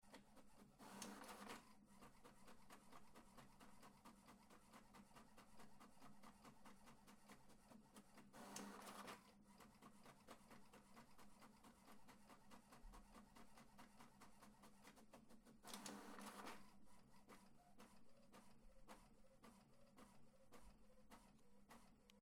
Sound effects > Objects / House appliances
Recorded on TASCAM - DR-05X. My HP printer at home.